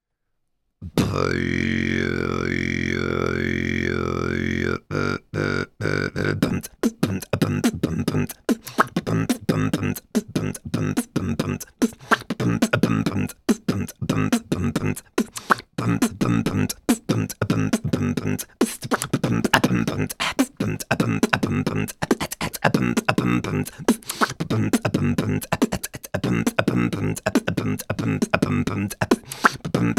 Music > Solo percussion
Unprocessed, unedited beatbox freestyle session